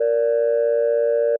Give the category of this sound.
Instrument samples > Synths / Electronic